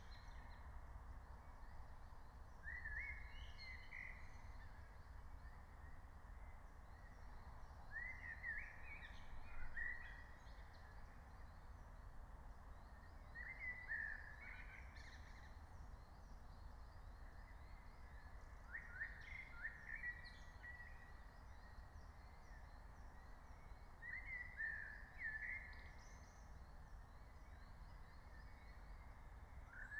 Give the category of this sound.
Soundscapes > Nature